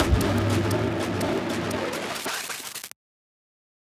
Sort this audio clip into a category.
Sound effects > Experimental